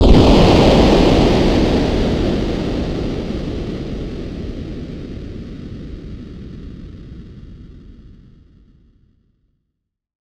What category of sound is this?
Sound effects > Electronic / Design